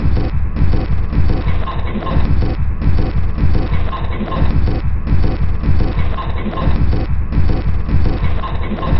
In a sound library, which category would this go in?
Instrument samples > Percussion